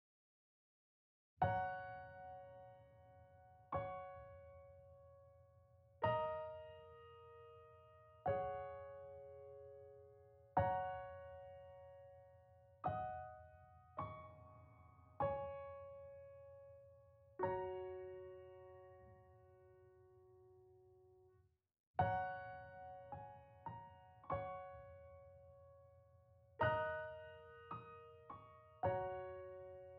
Music > Solo instrument
numb feet piano
addictive keys with d verb piano. free use.